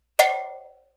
Sound effects > Objects / House appliances
aluminum can foley-021
alumminum, can, foley, fx, household, metal, scrape, sfx, tap, water